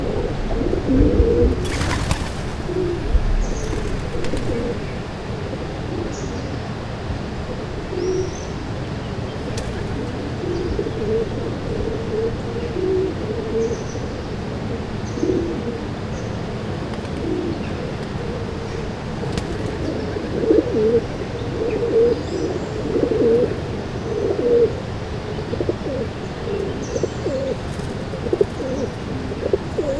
Sound effects > Animals
250629 08h10 Quai Choiseul - Pigeons
Subject : Recording the pigeons over the ledge of Quai Choiseul. Date YMD : 2025 June 29 Morning 08h10 Location : Albi 81000 Tarn Occitanie France. Sennheiser MKE600 with stock windcover P48, no filter. Weather : Sunny no wind/cloud. Processing : Trimmed in Audacity. Notes : There’s “Pause Guitare” being installed. So you may hear construction work in the background. Funnily enough a lady stopped a few meters away and contemplated the view as I was recording. It's probable that without my presence, she wouldn't have stopped to contemplate the view. I also had the competitive monkey brain to think "I must out contemplate the moment" and waited for her to pass by before stopping the recording lol. Tips : With the handheld nature of it all. You may want to add a HPF even if only 30-40hz.